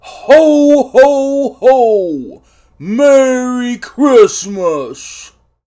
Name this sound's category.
Speech > Solo speech